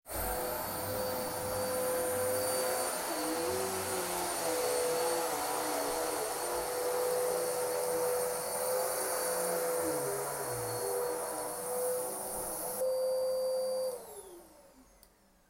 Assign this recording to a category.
Soundscapes > Indoors